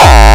Instrument samples > Percussion
Gabbar Kick 6 Fat
Retouched multiple kicks in FLstudio original sample pack. Processed with ZL EQ, Waveshaper.